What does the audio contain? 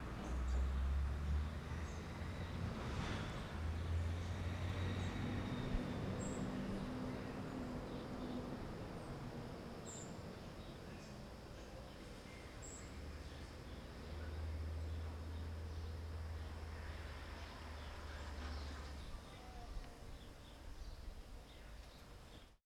Sound effects > Human sounds and actions
MALLORCA VILLAGE 01
Recorded on a small square in a residential neighbourhood near Palma. People talking, cars and scooters rifding by. Recorded with a Zoom H6 and compressed a little
BACKGROUND, MALLORCA, AMBIENCE, FOLEY